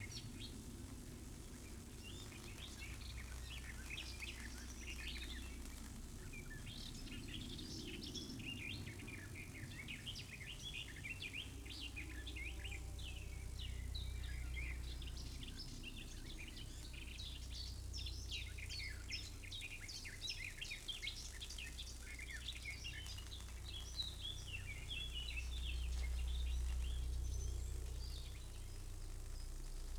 Soundscapes > Urban
Ligne Haute Tension 4 avions
Electric noise & hum of an overhead power line in the countryside; birds in the background. A plane passing. Le bourdonnement et le grésillement d'une ligne à haute tension, dans la campagne bourguignonne. Des oiseaux chantent dans le fond.
ambiance, ambience, birds, buzz, countryside, edf, electricite, electricity, field-recording, haute-tension, hum, noise, overhead-power-line, power, sizzling, voltage